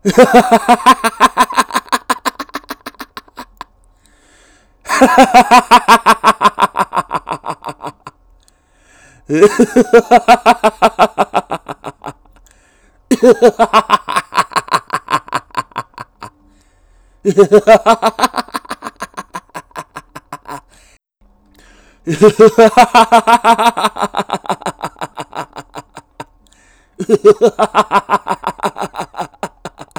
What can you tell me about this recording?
Sound effects > Objects / House appliances
VOXLaff-Blue Snowball Microphone, CU Laughter Nicholas Judy TDC
Blue-brand, Blue-Snowball, laugh, laughter, male